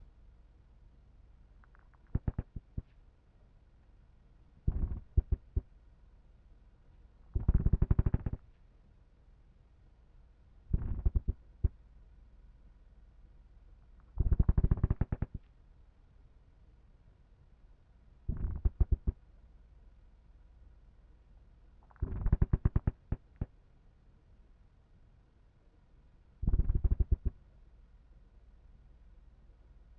Sound effects > Other
Some floor creaks to practice my recording and editing skills on. Recorded on a Shure MV6 microphone.